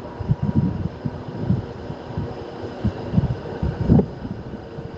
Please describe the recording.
Sound effects > Vehicles
tram passing distant occasional wind
Tram passing by at a distance with occasional wind distorting the recording. Recorded approximately 50 meters away from the tram tracks, using the default device microphone of a Samsung Galaxy S20+. TRAM: ForCity Smart Artic X34
tram, transport, urban